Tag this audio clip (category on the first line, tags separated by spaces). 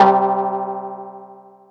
Instrument samples > Piano / Keyboard instruments
Lead Cowbell